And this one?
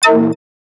Sound effects > Electronic / Design
Random UI Sounds 3
All samples used from FL studio original sample pack, I was tried to make a hardstyle rumble, but failed, I put it into vocodex because I was boring, then I got this sound.
Effect, UI